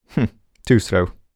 Speech > Solo speech
Cocky - Hmf too slow
Male, smug, NPC, U67, Video-game, voice, Neumann, Man, FR-AV2, Voice-acting, Tascam, dialogue, cocky, talk, oneshot, Mid-20s, Vocal, sentence, Human, singletake, Single-take